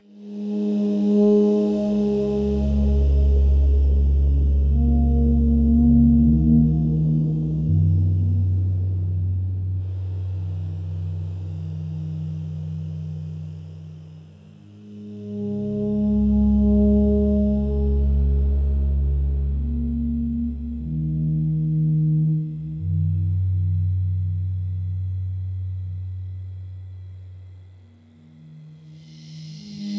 Music > Multiple instruments
Atonal Ambient Texture #006 - Breathing Soil
Experiments on atonal melodies that can be used as background ambient textures. AI Software: Suno Prompt: atonal, non-melodic, low tones, reverb, background, ambient, noise
ai-generated, ambient, atonal, experimental, pad, soundscape, texture